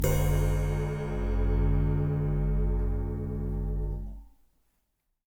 Music > Solo instrument
Zildjian 16 inch Crash-002
Cymbals, Drums, Oneshot, Drum, Metal, Kit, Perc, Cymbal, Custom, Crash, Percussion, 16inch, Zildjian